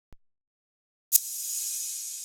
Instrument samples > Other
Verb Hat For Melody
A long open hat, it can also be used as a hihat
rap, grime, melody, hihat, long, hat, openhat, oneshot, longhat, hiphop, trap, 1shot